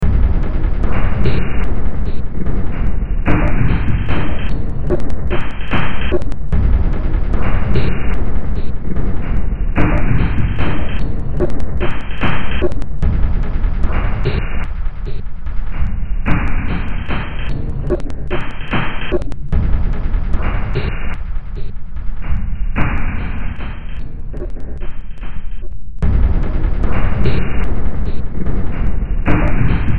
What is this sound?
Music > Multiple instruments
Demo Track #3933 (Industraumatic)
Ambient, Cyberpunk, Games, Horror, Industrial, Noise, Sci-fi, Soundtrack, Underground